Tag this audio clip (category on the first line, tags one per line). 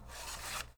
Sound effects > Objects / House appliances
Blue-brand
cardboard
tape
case
foley
Blue-Snowball
vhs
slide